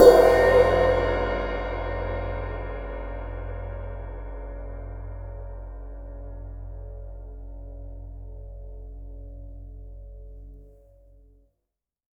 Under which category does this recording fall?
Music > Solo instrument